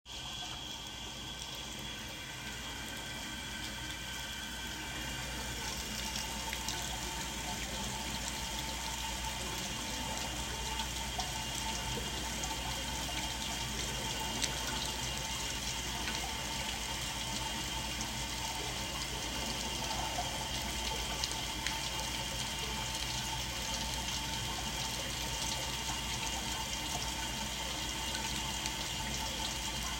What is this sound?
Sound effects > Other mechanisms, engines, machines
The sound is recorded on a street, and water is running from a tap